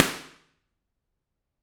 Sound effects > Other
Impulse n response Balloon - Kitchen - 3m95 x 3m80 x 2m73
Subject : Popping a Balloon next to omnidirectional microphones. Done the kitchen in a corner of a square room. Date YMD : 2025 July 01 Location : Albi 81000 Tarn Occitanie France. Hardware : Tascam FR-AV2 and Superlux ECM999 Weather : Processing : Trimmed and normalised in Audacity.
Impulse, Balloon, Tascam, Response, Home, Impulse-And-Response, Medium-large, Balloon-pop, Medium, Omni, FR-AV2, Kitchen, IR, Impulse-Response, Popping-Balloon, Omnidirectional, ECM999, Superlux, ECM-999, Corner